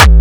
Instrument samples > Percussion
OldFiles-Classic Crispy Kick 1-A
Synthed with phaseplant only, just layered 2 noise generator and a 808 kick that synthed with sine wave, then overdrived them all in a same lane. Processed with Khs Distrotion, Khs Filter, Khs Cliper. Final Processed with ZL EQ, OTT, Waveshaper.
brazilianfunk, Crispy, Distorted, Kick, powerkick